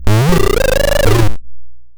Sound effects > Electronic / Design
Optical Theremin 6 Osc dry-065
Otherworldly, Spacey, Optical, Electronic, Digital, Handmadeelectronic, noisey, Electro, Experimental, Glitchy, Scifi, Robot, Synth, Theremins, Analog, Infiltrator, Instrument, Trippy, Sweep, Bass, Sci-fi, Dub, Theremin, Alien, Glitch, DIY, SFX, FX, Robotic, Noise